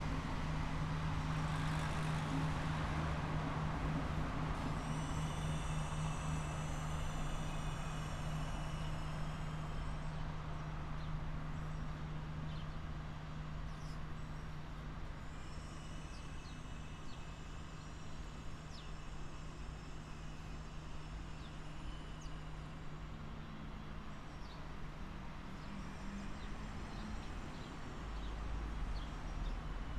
Soundscapes > Urban
Park outside with a train nearing the station
The general ambiance of the park right outside my house as a train is arriving at the nearby station. Recorded with the integrated microphones on a Zoom H5, stereo settings.
ambiance, city, field-recording, h5, park